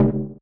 Instrument samples > Percussion
Native Percussions 1 Mid
Hi ! That's not recording sound :) I synth it with phasephant!